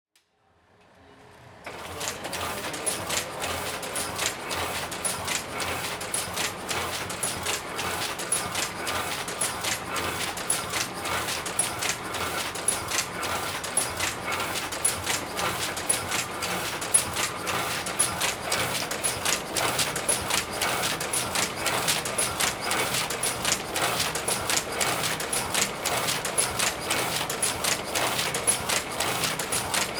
Sound effects > Other mechanisms, engines, machines
PERCUSIVA - IMPRENTA EN GALERiA CENTRO - LIMA - PERU
A printing machine in a percussion pattern. Recorded with a Tascam DR 40.